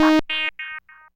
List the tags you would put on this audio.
Sound effects > Experimental
fx sfx bass vintage alien retro machine basses mechanical weird effect electro robotic electronic sweep snythesizer robot complex oneshot sample sci-fi trippy korg dark pad synth scifi bassy analog analogue

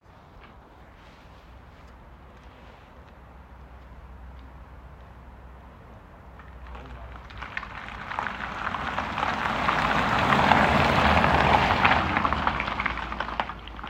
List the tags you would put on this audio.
Sound effects > Vehicles
driving electric vehicle

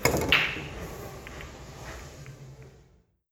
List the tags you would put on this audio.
Sound effects > Objects / House appliances
billiards break Phone-recording shot

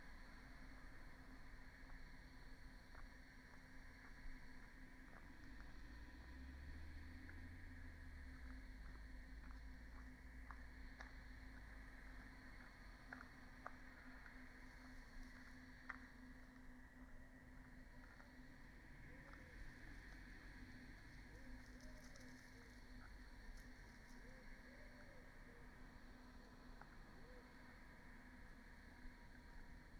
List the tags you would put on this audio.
Soundscapes > Nature
phenological-recording soundscape data-to-sound Dendrophone raspberry-pi artistic-intervention field-recording sound-installation nature modified-soundscape weather-data natural-soundscape alice-holt-forest

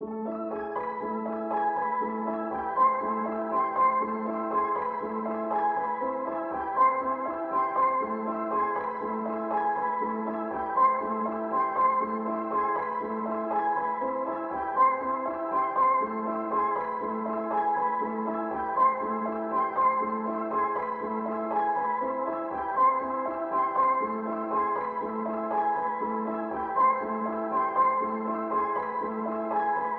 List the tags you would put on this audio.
Music > Solo instrument
simple reverb pianomusic music 120 simplesamples piano samples 120bpm free loop

